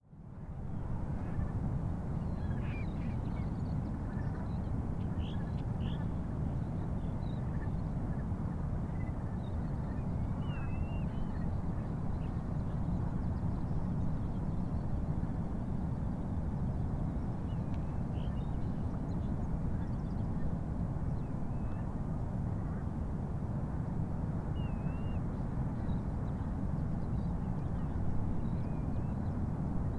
Soundscapes > Nature
seabird conversations 2 - baywood - 12.25
Various birds along the shoreline, out in the water, and in the sky talk and sing.
estuary, shore-birds